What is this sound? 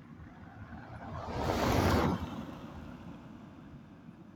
Sound effects > Vehicles
car driving by
automobile, drive, car